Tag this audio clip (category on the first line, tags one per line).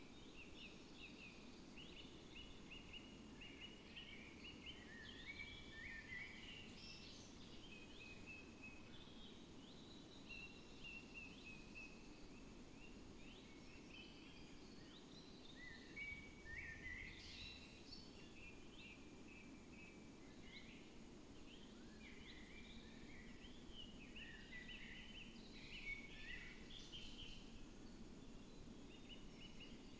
Soundscapes > Nature
alice-holt-forest
data-to-sound
artistic-intervention
Dendrophone
soundscape
nature
raspberry-pi
field-recording
phenological-recording
sound-installation
modified-soundscape
weather-data
natural-soundscape